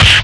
Instrument samples > Percussion
Phonk BeatBox Snare-1
A snare made with my mouth, recorded with my headphone's microphone Layerd samples from FLstudio original sample pack. Processed with Waveshaper, ZL EQ, ERA 6 De-Esser Pro.
Beatbox, Phonk, Snare